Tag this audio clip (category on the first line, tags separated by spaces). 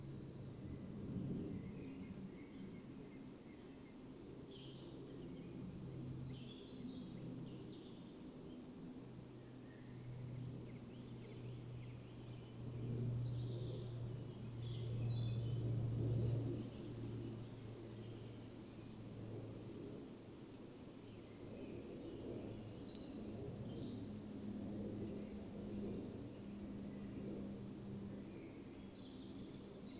Soundscapes > Nature
weather-data phenological-recording